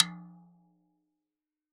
Music > Solo percussion
Hi Tom- Oneshots - 14- 10 inch by 8 inch Sonor Force 3007 Maple Rack
velocity, beat, toms, beats, fill, tomdrum, perc, drumkit, instrument, percussion, flam, kit, rimshot, beatloop, drums, studio, tom, hitom, drum, oneshot, rim, hi-tom, acoustic, percs, roll